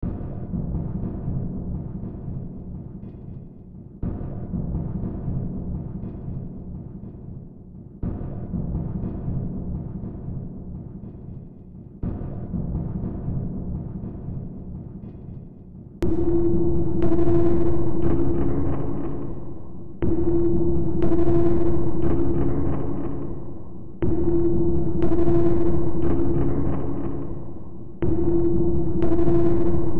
Music > Multiple instruments
Demo Track #3084 (Industraumatic)
Ambient, Games, Noise, Sci-fi